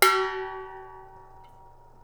Sound effects > Objects / House appliances
A metal bong.